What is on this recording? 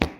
Sound effects > Other
Genuine fart recorded with smartphone.
fart, flatulence, gas